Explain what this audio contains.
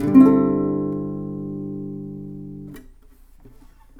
Music > Solo instrument
acoustic guitar pretty notes 3
acosutic, chord, chords, dissonant, guitar, instrument, knock, pretty, riff, slap, solo, string, strings, twang